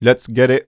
Speech > Solo speech
Lets get it
Subject : I was looking for a few "Dj chants" / vocals to hype up songs. Frankly I'm not a very good voice actor, not a hype person in general so these get out of my personality, therefore it was challenging and a little cringe as you can hear lol. Weather : Processing : Trimmed and Normalized in Audacity, Faded in/out. Notes : I think there’s a “gate” like effect, which comes directly from the microphone. Things seem to “pop” in. Tips : Check out the pack!
get, lets-get-it, Male, Neumann, oneshot, Single-take, Tascam, un-edited, Vocal